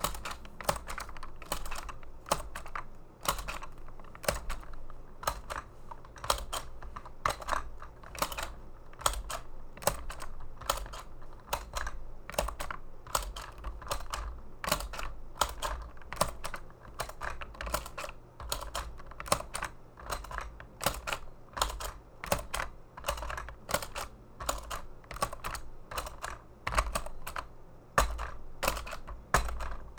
Sound effects > Objects / House appliances
A Rock Em' Sock Em' Robot punching.